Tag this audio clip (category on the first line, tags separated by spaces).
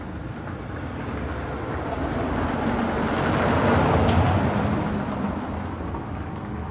Sound effects > Vehicles
vehicle transportation